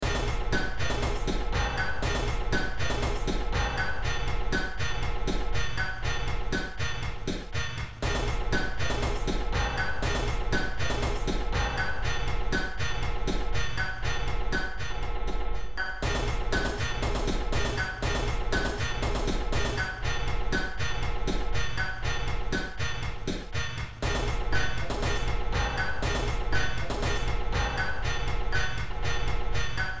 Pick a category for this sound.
Music > Multiple instruments